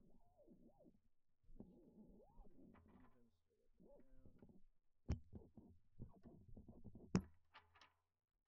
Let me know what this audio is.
Music > Solo percussion
floor tom rustling - 16 by 16 inch
drum, floortom, drums, oneshot, beatloop, roll, velocity, toms, percussion, flam, instrument, drumkit, studio, percs, rimshot, kit, beats, tomdrum, perc, acoustic, fill, rim, tom, beat